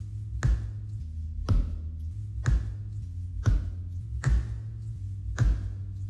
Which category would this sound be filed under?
Sound effects > Natural elements and explosions